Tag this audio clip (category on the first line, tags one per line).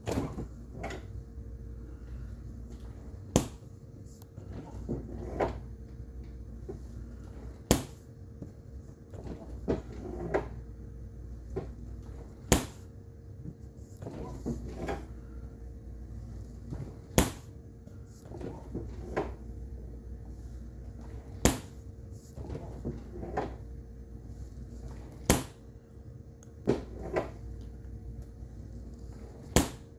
Sound effects > Objects / House appliances
refrigerator
close
door
foley
fridge
Phone-recording
open